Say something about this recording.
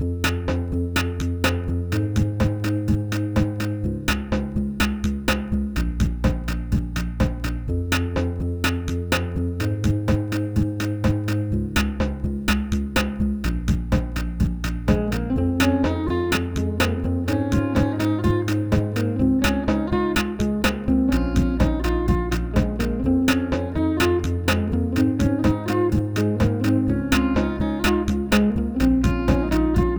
Music > Multiple instruments
A simple but catchy snippet of a song, like something from the 2000s. Made in FL Studio with my own drum samples in Amigo + a Fender guitar.